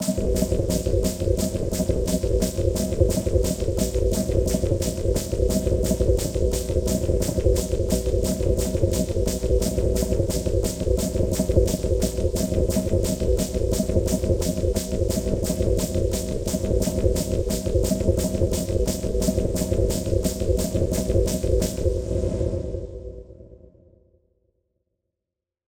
Instrument samples > Percussion
Simple Bass Drum and Snare Pattern with Weirdness Added 002
Bass-and-Snare, Bass-Drum, Experimental, Experimental-Production, Experiments-on-Drum-Beats, Experiments-on-Drum-Patterns, Four-Over-Four-Pattern, Fun, FX-Drum, FX-Drum-Pattern, FX-Drums, FX-Laden, FX-Laden-Simple-Drum-Pattern, Glitchy, Interesting-Results, Noisy, Silly, Snare-Drum